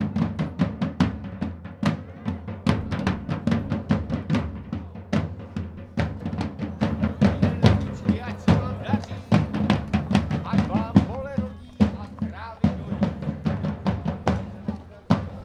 Sound effects > Human sounds and actions
traditional event in Czech
drums, czech